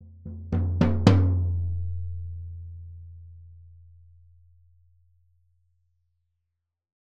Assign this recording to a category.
Music > Solo percussion